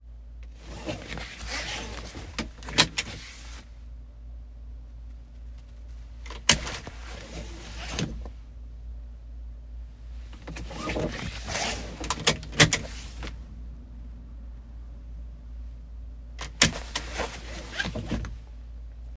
Sound effects > Vehicles
Seat belt being buckled and unbuckled in a Jeep Wrangler Sahara.
interior, automobile, auto, car, metal, vehicle, seat-belt